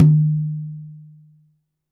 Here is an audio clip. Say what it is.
Music > Solo instrument
High Tom Sonor Force 3007-006
Drum, Drumkit, Drums, kick, kit, low, oneshot, perc, percussion, toms